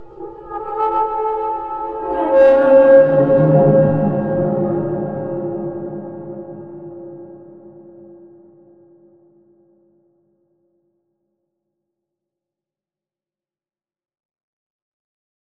Sound effects > Electronic / Design
Heavily edited and processed foley samples originated from an odd source - scratching dish plates together!
horror, terror, scary